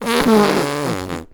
Sound effects > Human sounds and actions
Imitation of a fart done by my kid who is blowing air on his arm :)